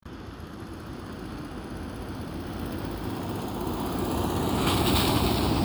Sound effects > Vehicles
A car passing by in Hervanta, Tampere. Recorded with Samsung phone.
car, engine, vehicle